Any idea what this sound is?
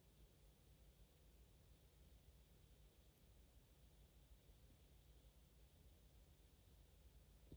Soundscapes > Indoors
UPF Library
I recorded these samples inside the Pompeu Fabra University Library on the Ciutadella campus in Barcelona during a late afternoon study period. The space is naturally quiet, with soft HVAC hum.
library, indoors, quietbarcelona